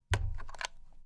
Sound effects > Other mechanisms, engines, machines
gun handle 7
Designed foley sound for less aggressive gun pickup from wooden table, with additional scrapes.
scrape, gun, handle, soft, handgun, wood, table